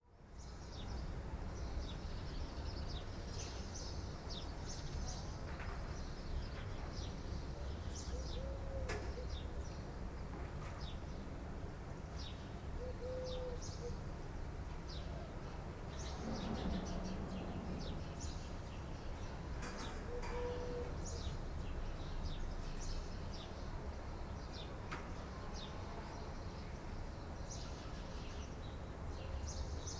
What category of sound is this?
Soundscapes > Urban